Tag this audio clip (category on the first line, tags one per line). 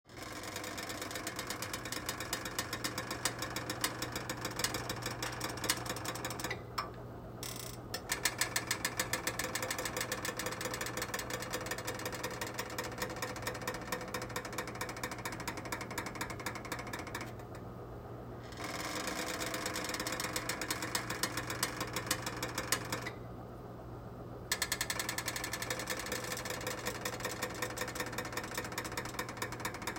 Sound effects > Objects / House appliances
heat
kitchen
metal
range
stove
stovetop
stress